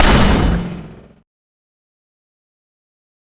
Sound effects > Other
Sound Design Elements Impact SFX PS 107
sharp, transient, heavy